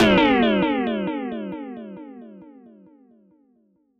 Sound effects > Electronic / Design
CRASH LOWBIT UNIQUE ERROR
UNIQUE, CIRCUIT, ELECTRONIC, OBSCURE, HARSH, BEEP, INNOVATIVE, DING, HIT, CHIPPY